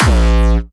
Instrument samples > Other
Did some FX and Pitching works in JumpstyleKick1
Distortion, Hardstyle, Jumpstyle, Oldschool